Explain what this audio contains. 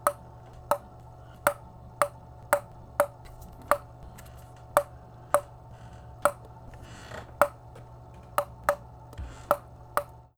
Sound effects > Objects / House appliances
Many ping pong serves.